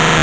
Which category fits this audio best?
Sound effects > Electronic / Design